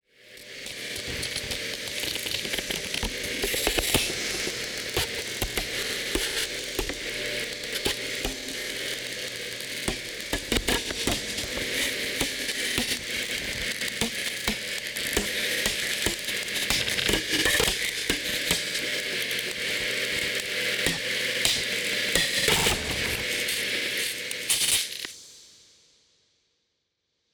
Sound effects > Objects / House appliances
Konkret Jungle 3
From a pack of samples focusing on ‘concrete’ and acousmatic technique (tape manipulation, synthetic processing of natural sounds, extension of “traditional” instruments’ timbral range via electronics). This excerpt is based upon the sounds of slowly pulling a strip of packing tape through a tape gun, with added VST processing and noise added by Unfiltered Audio's 'Silo' and 'SpecOps' plugins.
acousmatic, digital-noise, musique-concrete, objet-sonore, packing-tape, Unfiltered-Audio